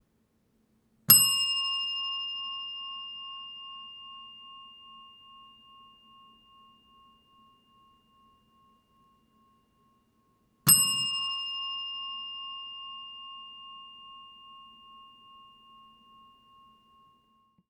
Sound effects > Objects / House appliances
Ring tone Recorded that sound by myself with Recorder H1 Essential / in office